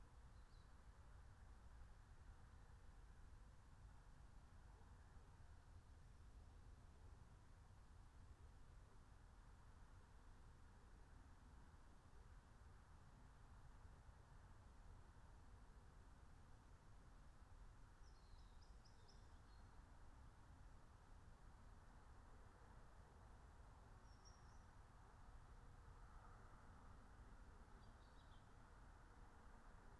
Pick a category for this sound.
Soundscapes > Nature